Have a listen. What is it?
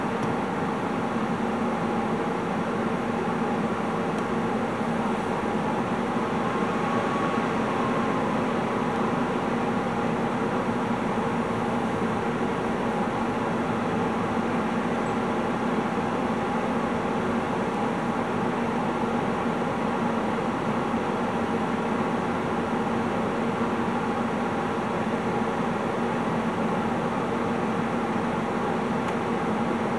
Sound effects > Other mechanisms, engines, machines
PC Ambient Sound
Sound created by me.